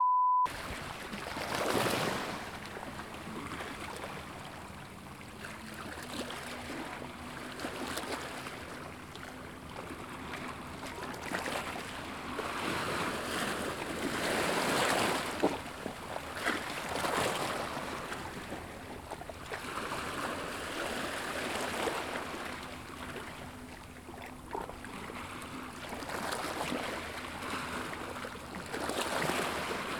Soundscapes > Nature
AMBIENCE-EXTERIOR-BEACH-WAVES-ROCKS-4824
Beach ambience recorded in Saint-Raphaël, France Smallwaves crashing on the beach's rocks, boulders. Recorded with a tascam DR40.
field-recording, Mediterranean, nature, seaside, shore